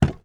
Sound effects > Vehicles
Ford 115 T350 - Door opening
Subject : Recording a Ford Transit 115 T350 from 2003, a Diesel model. Date YMD : 2025 August 08 Around 19h30 Location : Albi 81000 Tarn Occitanie France. Weather : Sunny, hot and a bit windy Processing : Trimmed and normalised in Audacity.